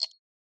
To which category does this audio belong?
Sound effects > Objects / House appliances